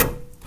Sound effects > Other mechanisms, engines, machines
Handsaw Oneshot Hit Stab Metal Foley 10
twang, percussion, hit, vibe, tool, smack, plank, perc, shop, foley, sfx, handsaw, household, metal, vibration, saw, twangy, fx, metallic